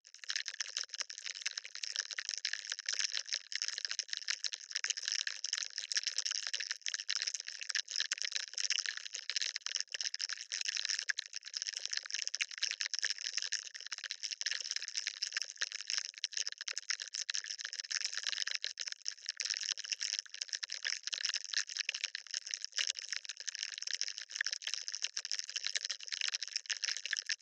Sound effects > Electronic / Design
ROS-Tooth Quiver Texture
Organic, Botanica, FX, Texture, Effect